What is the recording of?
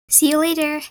Speech > Solo speech
Female Shopkeeper Bye
A female shopkeeper says something. Recorded and edited in Turbowarp Sound Editor
female, speak, voice